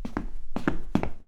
Sound effects > Human sounds and actions
footsteps, shuffle hardwood02
Recorded with rode nt1